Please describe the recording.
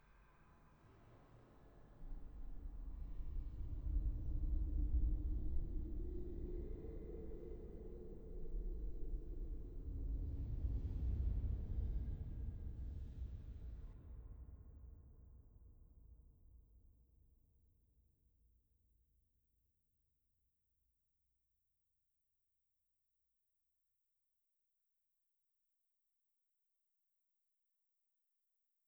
Soundscapes > Other
Here's a wind recording that I made by blowing into my microphone and then applying reverb and stretching to the audio.